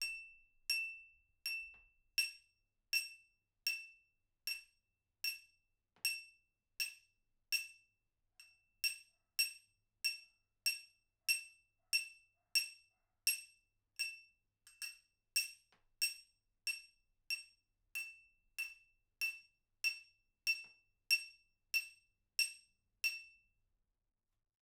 Sound effects > Other
Glass applause 30
glass, applause, wine-glass, single, FR-AV2, individual, clinging, XY, cling, indoor, stemware, NT5, Tascam, Rode, solo-crowd, person